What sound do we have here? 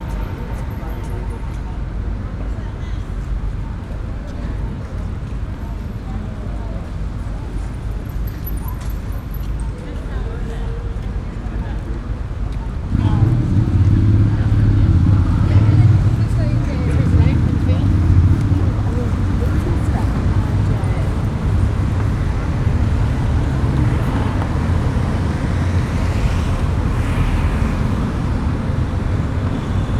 Soundscapes > Urban
City street with traffic and people passing by, traffic lights beep and people talking.
people, town, street, traffic